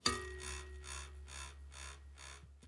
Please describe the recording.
Sound effects > Other mechanisms, engines, machines
Heavy Spring 03
garage, noise, spring